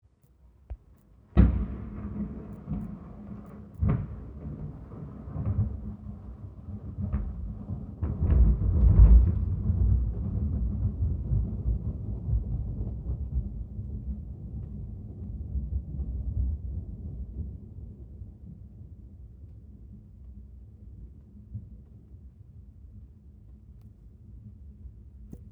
Sound effects > Natural elements and explosions
Close thunder with deep rumble
Recorded on the 14th of June 2025
rain, weather, storm, rumble, thunder, thunderstorm, lightning